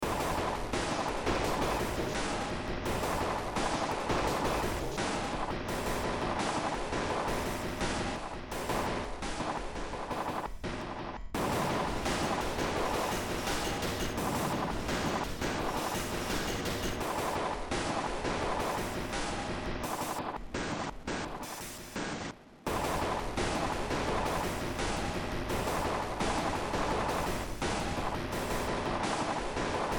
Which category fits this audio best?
Music > Multiple instruments